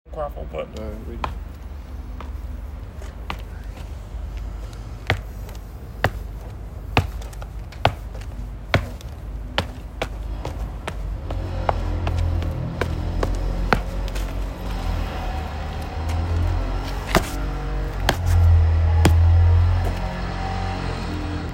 Sound effects > Natural elements and explosions
Koa walking on stone1
floor, stone, walking